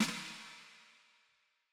Music > Solo percussion
Snare Processed - Oneshot 24 - 14 by 6.5 inch Brass Ludwig
rimshot, oneshot, fx